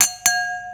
Sound effects > Objects / House appliances
Fork against the glass two times
A fork used to hit a glass the glass two times